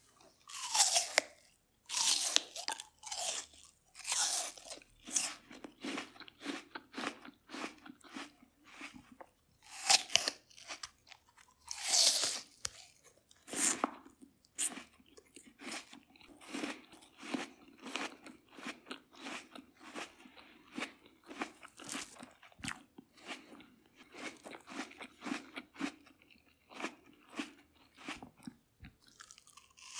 Sound effects > Human sounds and actions
Food - Eating a fruit
Eating an apple in ASMR style. The apple's skin is thick, which creates cracking sounds. * No background noise. * No reverb nor echo. * Clean sound, close range. Recorded with Iphone or Thomann micro t.bone SC 420.
Adam, apple, asmr, bite, biting, chew, chewing, crunch, crunching, eat, eating, Eve, figg, food, fruit, health, healthy, kitchen, miam, mouth, munch, munching, nature, picnic, snack, teeth, yum, yummy